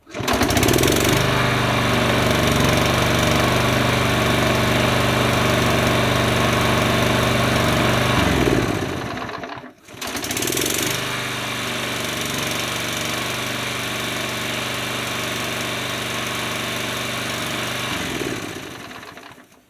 Sound effects > Other mechanisms, engines, machines
166cc gas-powered pushmower, sitting nine months, "cold" start
The same red 166cc pushmower finally gets to run, nine months later. This audio is after a slight governor adjustment and oilchange (probably unnecessary as it had only done about 10-15hrs on new SAE 30 oil nine months before). Oil had no bits or glitter, piston and sparkulator look clean. Sound is split into two parts, same takes: the first take is processed, the second is unprocessed. The LQ take is at #0:10 (technically 0:9.7).
engine, lawnmower, motor, mower, run, shutoff, start